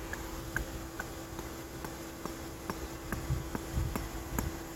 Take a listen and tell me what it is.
Sound effects > Objects / House appliances
METLImpt-Samsung Galaxy Smartphone, MCU Stake, Hammer, Hit, Tent, Vampire Nicholas Judy TDC
A hammer hitting a tent stake or staking a vampire.
hit, stake, foley, Phone-recording, tent, vampire